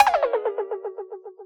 Instrument samples > Synths / Electronic
CR5000-cowbell 01
80s, Analog, AnalogDrum, Beat, CompuRhythm, CR5000, Drum, DrumMachine, Drums, Electronic, Loop, Roland, Synth, Vintage